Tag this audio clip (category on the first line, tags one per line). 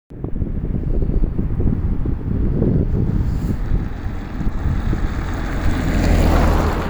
Sound effects > Vehicles
car
traffic